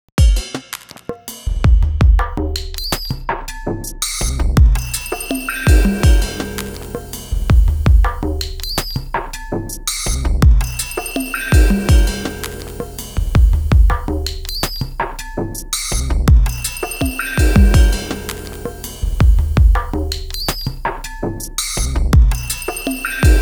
Music > Multiple instruments
perc beat 4
a groovy percussion loop i created in fl studio with various real samples
beat, beatloop, breakbeat, chill, clean, drum, drumloop, drums, funky, groovy, kit, kitloop, loop, perc, percs, percussion, percussion-loop, per-loop, quantized